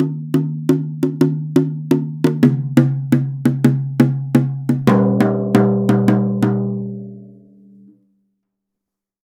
Music > Solo instrument
Toms Misc Perc Hits and Rhythms-016
Crash, Custom, Cymbal, Cymbals, Drum, Drums, FX, GONG, Hat, Kit, Metal, Oneshot, Paiste, Perc, Percussion, Ride, Sabian